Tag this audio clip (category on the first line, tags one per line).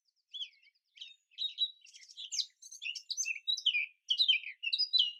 Sound effects > Animals
blackcap
songbird
morning
nature
chirp
Bird
field-recording
birds